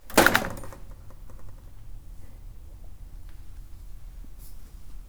Sound effects > Other mechanisms, engines, machines
Woodshop Foley-075
bam, bang, boom, bop, crackle, foley, fx, knock, little, metal, oneshot, perc, percussion, pop, rustle, sfx, shop, sound, strike, thud, tink, tools, wood